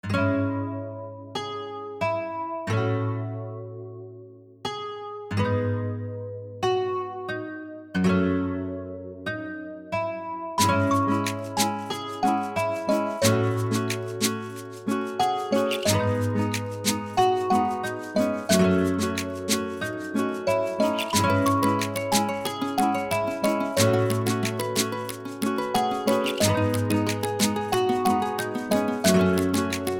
Music > Multiple instruments
A little South American music made with fl studio (Please don’t hesitate to leave a little comment.) Instruments: -Guitare -Xylophone 130 bpm
Loop, Guitare, Dance, Music